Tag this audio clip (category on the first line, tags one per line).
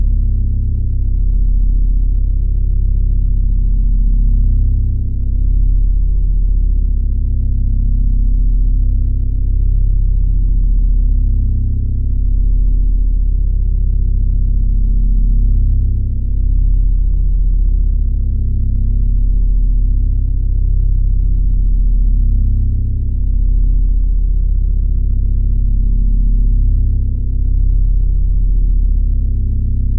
Soundscapes > Synthetic / Artificial
ambient
artificial
low-frequency